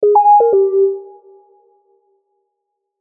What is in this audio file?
Instrument samples > Piano / Keyboard instruments
A Mysterious Sounding Notification Sound.